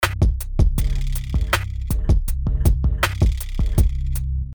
Music > Solo percussion
Loop drums Hip Hop
80 bpm FL11
92, bpm, com, drum, drums, hip, hiphop, hop, loop, looppacks, sample